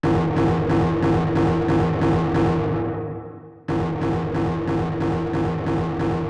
Music > Other
Unpiano Sounds 003
Distorted-Piano, Distorted, Piano